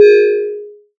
Instrument samples > Synths / Electronic
CAN 1 Ab
bass, additive-synthesis